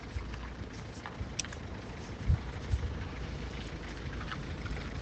Sound effects > Vehicles
car idle
Car equipped with studded tyres moving at idle speed on a paved parking lot. Recorded in an urban setting in a near-zero temperature, using the default device microphone of a Samsung Galaxy S20+.